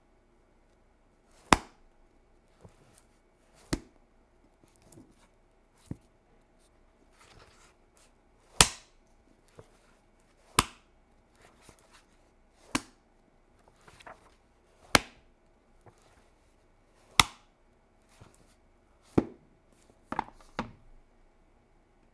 Sound effects > Objects / House appliances
Hardcover close
I snap close a hardcover book